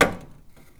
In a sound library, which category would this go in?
Sound effects > Other mechanisms, engines, machines